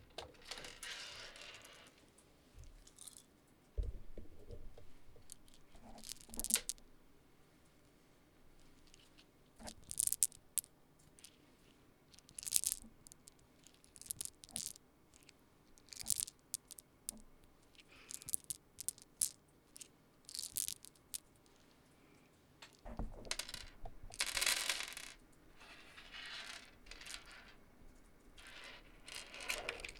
Sound effects > Human sounds and actions
Dropping handful of seeds / pills / beads into palm and onto table top- picking up
Gathering pills or seeds into the palm, dropping them onto a table, and gathering them back together.